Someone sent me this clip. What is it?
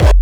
Instrument samples > Percussion
BrazilFunk Kick 11
Layered multiple samples from FLstudio original sample pack. Processed with ZL EQ, Waveshaper.
BrazilFunk, Distorted, Kick